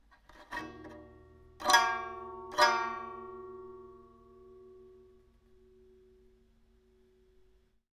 Instrument samples > String
Plucking broken violin string 5
Plucking the string(s) of a broken violin.